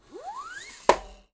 Sound effects > Electronic / Design
TOONMisc-Blue Snowball Microphone, CU Whizz, Bonk Nicholas Judy TDC
A whizz and bonk.
Blue-brand, Blue-Snowball, bonk, cartoon, whizz